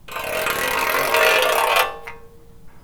Sound effects > Other mechanisms, engines, machines
Handsaw Tooth Teeth Metal Foley 3
metallic, foley, handsaw, hit, fx, percussion, shop, sfx